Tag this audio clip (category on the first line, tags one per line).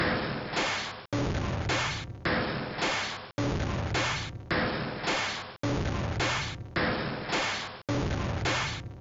Instrument samples > Percussion
Alien
Weird
Drum
Samples
Loop
Industrial
Loopable
Ambient
Dark
Underground
Soundtrack
Packs